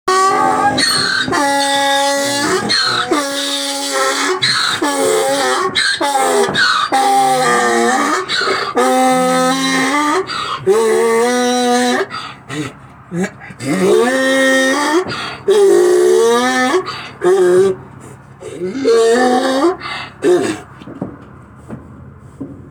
Sound effects > Animals
Donkeys - Two Donkeys Braying, Close Perspective
Recorded using an LG Stylus 2022, these donkeys in a trailer braying